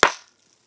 Sound effects > Natural elements and explosions
sound made using bubble wrap